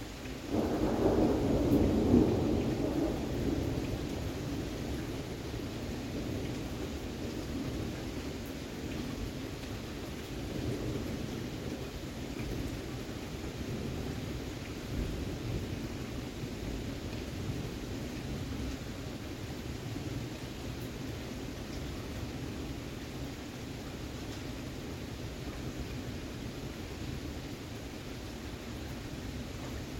Soundscapes > Nature
STORM-Samsung Galaxy Smartphone, MCU Thunderstorm, Heavy Rain, Loud Boom At Beginning Nicholas Judy TDC
Thunderstorm with heavy rain - thunder boom in the very beginning.